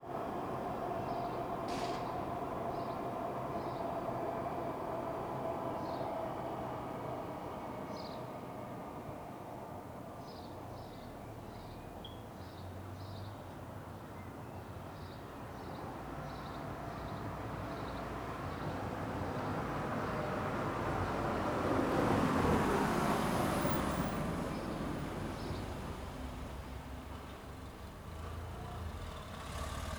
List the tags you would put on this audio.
Soundscapes > Urban
splott wales

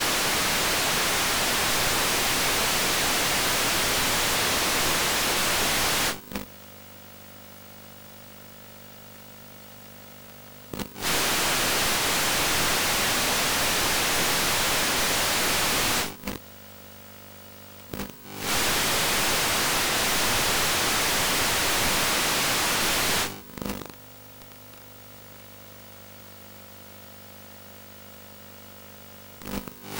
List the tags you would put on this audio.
Sound effects > Objects / House appliances
UHF CRT tuning static channel electric noise tv analog television radio

